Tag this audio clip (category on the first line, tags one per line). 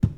Sound effects > Objects / House appliances
lid
pour
container
knock
plastic
shake
scoop
clatter
tip
spill
hollow
metal
bucket
carry
clang
garden
drop
slam
liquid
object
household
debris
pail
water
tool
foley
fill
handle
kitchen
cleaning